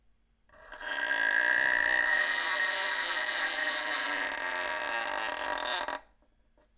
Instrument samples > String
Bowing broken violin string 2
violin, broken, uncomfortable, strings, bow